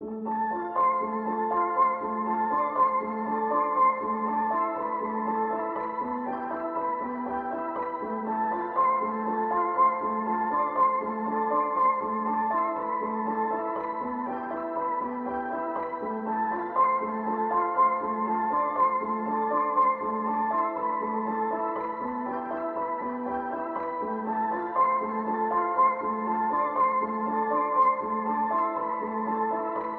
Music > Solo instrument
Piano loops 194 efect 2 octave long loop 120 bpm
120bpm, loop, music, pianomusic, simplesamples